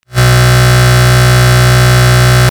Instrument samples > Synths / Electronic
Frenchcore Bass Testing 1-B
Synthed with phaseplant only. A training of Frenchcore bass synth. Kilohearts Snap-in used: Disperser, Slice EQ, Shaper Table, Cliper, Khs Distortion.
Bass, Distorted, Frenchcore, Hard, Hardcore, Hardstyle